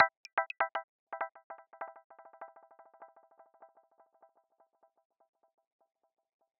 Sound effects > Electronic / Design
Pluck-Bubule Pluck E Maj Chord
Just a easy botanica pluck that synthed with phaseplant. All plugin used from Khs Ultimate only.
Botanica, Chord, Drewdrop, FX, Pluck, Water